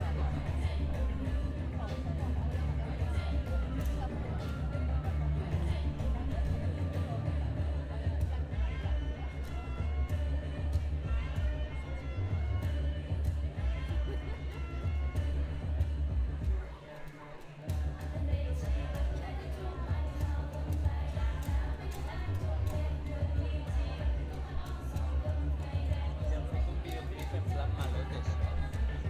Soundscapes > Urban
Live music recording from a circus show in Siem Reap, Cambodia, on May 22, 2019. Features background music and a festive audience atmosphere.